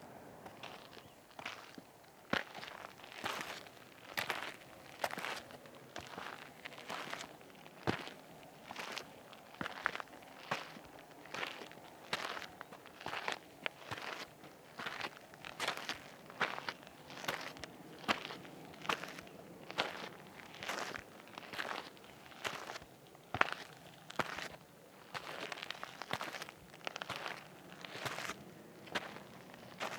Soundscapes > Nature
Pasos Gravilla / Footsteps Gravel
Footsteps on gravel, walking near the beach Mirasol, Chile Recorded with: Tascam DR100 MK-II , Sound Devices 302 Mixer, Sennheiser MKH 416
footsteps, chile, walking